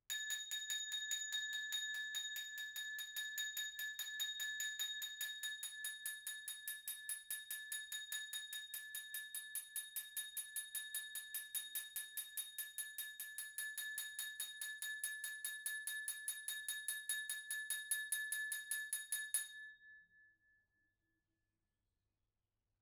Sound effects > Other
Glass applause 17
applause, cling, clinging, FR-AV2, glass, individual, indoor, NT5, person, Rode, single, solo-crowd, stemware, Tascam, wine-glass, XY